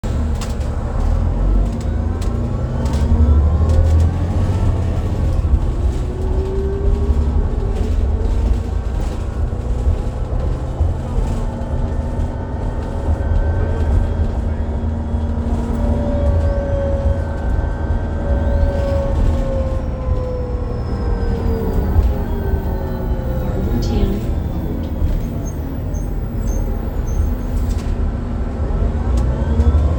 Sound effects > Vehicles
2007 New Flyer D40LFR Transit Bus #3 (MiWay 0735)
I recorded the engine and transmission sounds when riding the Mississauga Transit/MiWay buses. This is a recording of a 2007 New Flyer D40LFR transit bus, equipped with a Cummins ISL I6 diesel engine and Voith D864.5 4-speed automatic transmission. This bus was retired from service in 2025.
miway, transit, bus, isl, ride, d40, d40lf, new, engine, voith, driving, public, flyer